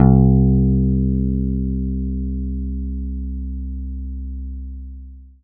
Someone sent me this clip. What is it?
Instrument samples > String
One octave of real bass guitar recorded with a pick. The sound is completely dry and unprocessed, so you can shape it any way you like.
bass, guitar, instrument, picked, real, riff